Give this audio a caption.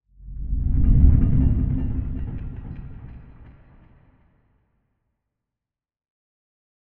Sound effects > Electronic / Design
Sound From The Depths 1
cinematic-sting, spooky-sound, jumpscare-sound, loud-jumpscare, horror-impact, scary-sound, horror-stab, whack-hectic-guy, thrill-of-fear, spooky-cinematic-sting, startling-sound, jumpscare-sound-effect, spooky-hit, startled, cinematic-stab, jumpscare, sound-from-the-depths, startled-noise, horror-hit, horror-sting, horror-sound, what-the, loud-jumpscare-sound, jumpscare-noise, Lux-Aeterna-Audio, cinematic-hit, underground-sound, Dylan-Kelk